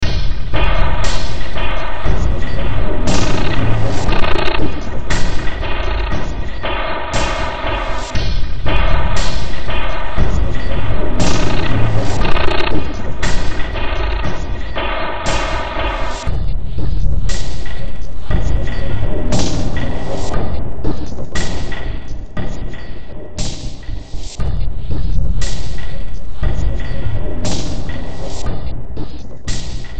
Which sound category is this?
Music > Multiple instruments